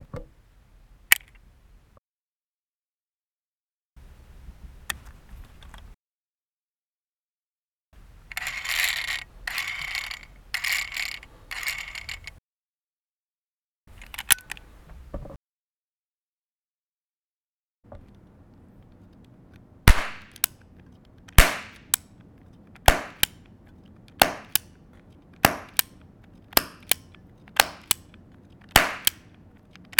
Sound effects > Objects / House appliances
Cap Gun Revolver Sound Effects (Loading, Spinning, Click, Cap Gun Firing)
Gun sounds made using a cap gun revolver. First sound is the sound of opening the chamber, then the sound of spinning the barrel, then closing the chamber and finally sounds of me shooting with it. Recorded this sound effect using a Zoom Audio Recorder H6. Credit isn’t necessary, though obviously appreciated if possible.